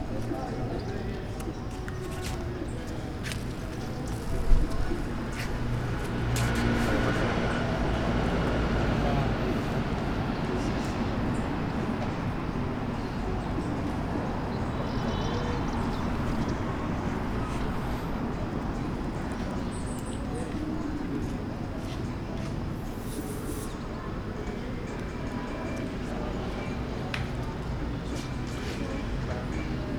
Sound effects > Human sounds and actions
20250326 JardiJaponesCanFabra2 Nature Music Humans Steps Nice Energetic
Urban Ambience Recording in collab with Martí i Pous High School, Barcelona, March 2025, in the context of a sound safari to obtain sound objects for a sound narrative workshop. Using a Zoom H-1 Recorder.
Energetic, Humans, Music, Nature, Nice, Steps